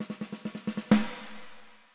Music > Solo percussion
snare Processed - steady fill 2 - 14 by 6.5 inch Brass Ludwig
hits, drums, roll, snareroll, oneshot, realdrum, beat, processed, fx, crack, snaredrum, sfx, snares, percussion, perc, hit, rim, flam, acoustic, rimshot, snare, ludwig, reverb, kit, drum, drumkit, brass, rimshots, realdrums